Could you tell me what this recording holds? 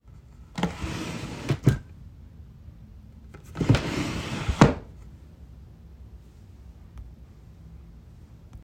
Sound effects > Objects / House appliances
Tallboy Dresser Open and Close
A tallboy wooden dresser is opened and closed in a bedroom.